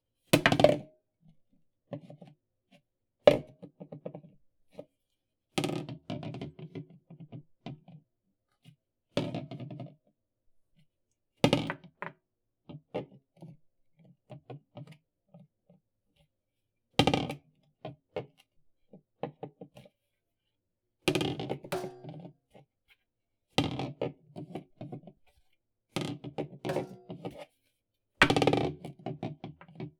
Sound effects > Objects / House appliances
Picking up and dropping a plastic bowl on a countertop multiple times.
bowl, drop, hit, impact, plastic